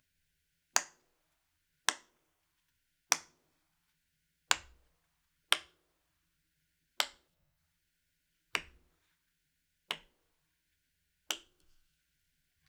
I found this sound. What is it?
Sound effects > Objects / House appliances
Fidget Toy Bubble Pops for sound effects. Use for whatever you'd like! Recorded on Zoom H6 and Rode Audio Technica Shotgun Mic.